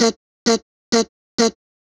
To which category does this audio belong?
Speech > Solo speech